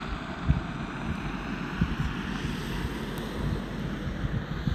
Soundscapes > Urban
Car passing by 19
car, city, driving, tyres